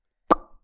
Sound effects > Human sounds and actions
Loud lips smacking pop noise. I recorded this with a zoom audio recorder.